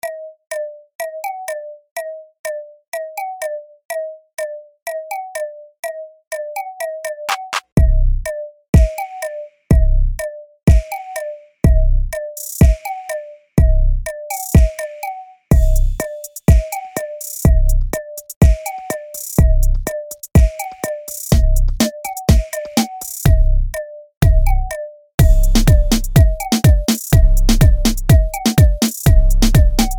Music > Multiple instruments
A small loop I made a year ago and wasn't sure what to do with it, so enjoy. Made on soundtrap.